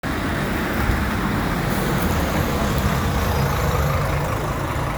Sound effects > Vehicles
bus1 decelerate to stop
A bus decelerated on an almost-winter day. The sound was recorded in Hervanta, Tampere, using the built-in microphone of the Samsung Galaxy S21 FE. No special grea was used besides that; the recorder just simply tried his best to prevent noise. The sound was recorded to be used as a sample for a binary audio classification project.
field-recording, Tram